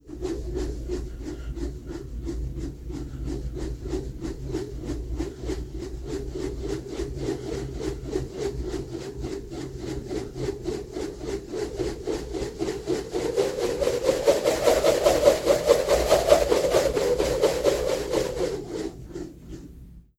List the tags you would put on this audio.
Sound effects > Objects / House appliances
foley
twirl
swish
Phone-recording
rope

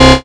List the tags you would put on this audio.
Instrument samples > Synths / Electronic
additive-synthesis
fm-synthesis

